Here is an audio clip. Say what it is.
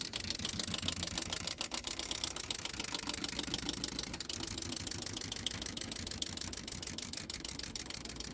Sound effects > Objects / House appliances
TOYMech-Samsung Galaxy Smartphone, MCU Wind Up Toy Clacking Nicholas Judy TDC
A wind-up toy clacking.
clack, Phone-recording